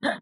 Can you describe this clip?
Speech > Solo speech
Random Brazil Funk Volcal Oneshot 9
It may sounds like minecraft vilager, but actually it's my voice. Recorded with my Headphone's Microphone, I was speaking randomly, I even don't know that what did I say，and I just did some pitching and slicing works with my voice. Processed with ZL EQ, ERA 6 De-Esser Pro, Waveshaper, Fruity Limiter.
Volcal, EDM, Acapella